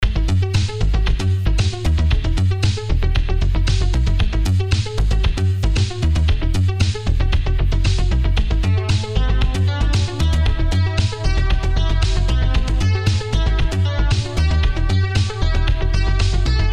Music > Multiple instruments
Around 115bpm, made in FL Studio using Diva and some reverb. A quick, catchy eighties style song snippet that can be looped if needed. Reminds me of Miami Vice music, or something 1980s cyberpunk. Features a heavy, pulsing bass line.